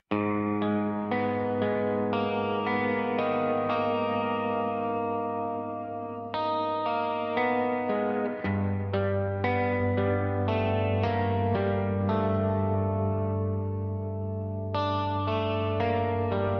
Music > Other
depressive BM electric guitar sample
BM, depressive, electric, guitar, sample